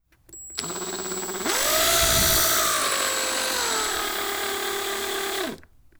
Sound effects > Other mechanisms, engines, machines
Milwaukee impact driver foley-004
Metallic, Drill, Motor, Workshop, Household, Foley, fx, Mechanical, Scrape, Shop, Woodshop, Tool, Impact, sfx, Tools